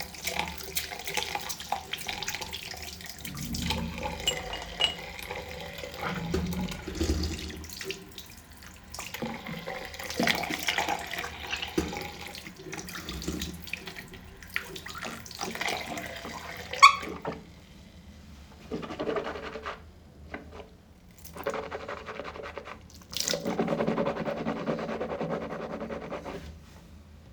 Sound effects > Natural elements and explosions
WATRMvmt water movement glass MPA FCS2
water being moved in a glass
manipulated, water